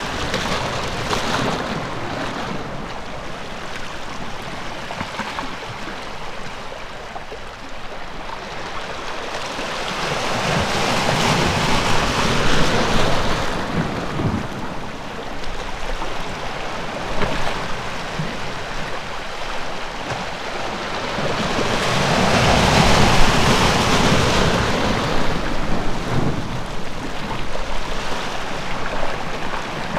Soundscapes > Nature
The Normandy sea 3
English Channel sea from normandie, Granville. Recorded on December 31, 2025 with Stogie's microfon in a ZOOM F3.
beach, ocean, sea, water, waves